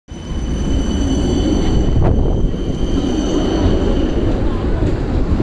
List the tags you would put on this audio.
Sound effects > Vehicles
rail tram vehicle

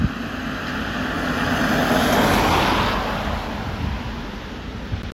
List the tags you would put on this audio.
Soundscapes > Urban
traffic vehicle car

